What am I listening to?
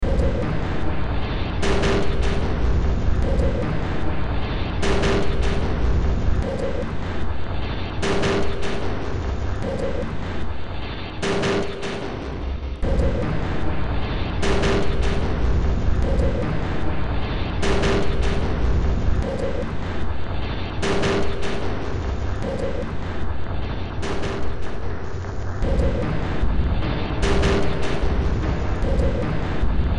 Music > Multiple instruments
Short Track #2984 (Industraumatic)
Ambient, Industrial, Horror, Cyberpunk, Sci-fi, Soundtrack, Noise, Games, Underground